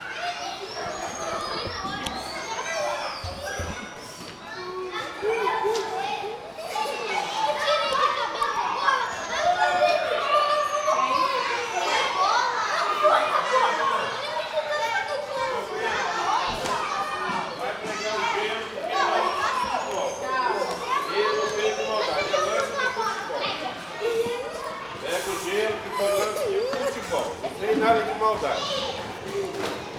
Soundscapes > Indoors
9 - Vozes crianças brincando no pátio da escola - Children voices on school-yard (brazilian portuguese)
Áudio gravado no Colégio Objetivo de Botafogo, na cidade do Rio de Janeiro (Rua Álvaro Ramos, n° 441) no dia 6 de outubro de 2022. Gravação originalmente feita para o documentário "Amaro: O Colégio da Memória", sobre o vizinho Colégio Santo Amaro, que fechou durante a pandemia. Crianças no final do recreio, correndo, gritando, brigando e chorando por uma partida de futebol, brincando, conversando, relativamente distantes do microfone. Vozes de adultos são ouvidas ocasionalmente, funcionárias da escola, falando comigo e com as crianças. Foi utilizado o gravador Zoom H1N. // Audio recorded at the Objetivo School in the Botafogo neighborhood, in Rio de Janeiro, on the october 6th, 2022. Recording originally made for the brazilian documentary feature film "Amaro: The School in Our Memory", which tells the story of the Santo Amaro School, also located in Botafogo, but closed during the pandemic.
arguing, brasil, brasileiros, brazil, brazilians, child, children, escola, football, futebol, infantil, kid, kids, kindergarten, patio, playground, portugues, portuguese, quadra, recreio, school, shcool-yard, soccer, voices, vozerio, walla, yelling